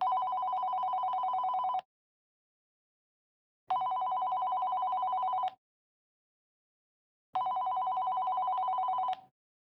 Sound effects > Objects / House appliances
Old Digital Phone Ring
80s, 90s, electronic digital phone ringing. Gear: H4n Sennheiser MKH 50
old, alert, digital, vintage, tone, call, office, phone, telephone, electric, ring